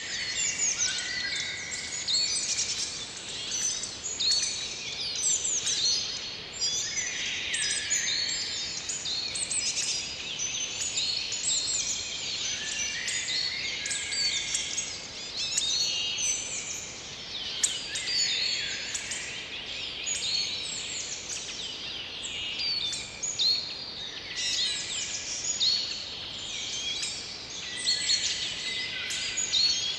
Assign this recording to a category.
Soundscapes > Nature